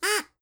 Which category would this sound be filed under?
Sound effects > Animals